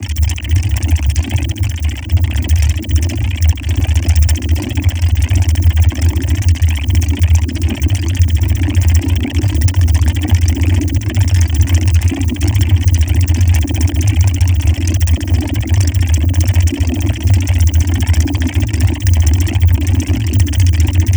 Sound effects > Electronic / Design
RGS-Random Glitch Sound 10-Glitch Amniotic Fluid-Voxed-1
It was retouched from a fail zaag kick that I made with 3xOsc , Waveshaper and ZL EQ. I just stretch and ring mod it so lot to see what will happen, then I get this sound. Processed with Vocodex and multiple Fracture